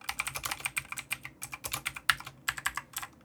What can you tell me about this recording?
Sound effects > Objects / House appliances
Fast Mechanical Keyboard Typing 02
Fast typing on a mechanical keyboard. - Take 2 Keyboard: havit HV-KB389L - Blue Switches